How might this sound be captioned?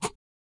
Sound effects > Objects / House appliances
Fountainpen Draw 1 Texture
Drawing on notebook paper with an ink fountain pen, recorded with an AKG C414 XLII microphone.